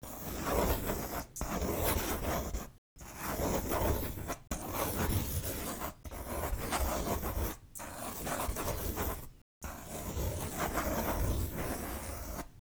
Sound effects > Objects / House appliances
Pencil scribble aggressively
Pencil scribbles/draws/writes/strokes aggressively.
write, draw